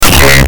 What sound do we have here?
Instrument samples > Synths / Electronic
I used harha's kick, again. at this point, imma just stop saying it unless i use a different one.
Kick, Harsh